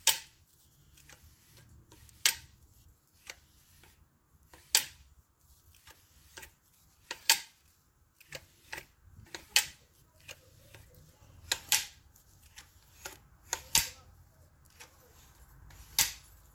Sound effects > Objects / House appliances
TOOLGrdn-Samsung Galaxy Smartphone, CU Pruners, Cut, No Branch, Crab Claws Nicholas Judy TDC
Pruners cut, no branch. Also useful for crab claws or pinchers.
claws, crab, cut, foley, Phone-recording, pinchers, pruners